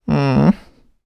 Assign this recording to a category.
Speech > Solo speech